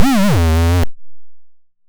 Sound effects > Electronic / Design
Optical Theremin 6 Osc dry-083
Robotic, Infiltrator, Sweep, Electro, Alien, Sci-fi, Theremin, Bass, Analog, Noise, SFX, Electronic, Dub, Glitch, Spacey, Robot, DIY, Synth, Glitchy, Theremins, Optical, Handmadeelectronic, Digital, FX, Experimental, Instrument, Scifi, Trippy, Otherworldly, noisey